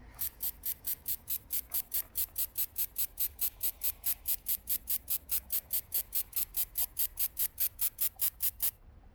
Sound effects > Animals
Katydid or Cricket on street
A chirping bug by the street. I filtered out the wind/traffic noise as best I could.
bug, chirp, cricket, field-recording, insect, katydid, night, road, street